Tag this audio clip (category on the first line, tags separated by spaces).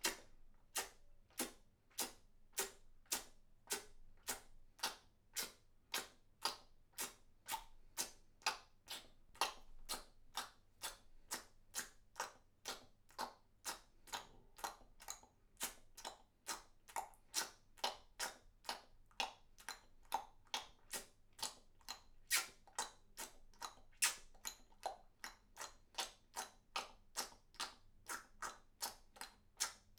Sound effects > Human sounds and actions
individual FR-AV2 person experimental Alien original applause solo-crowd XY single Tascam NT5 indoor Rode weird alternate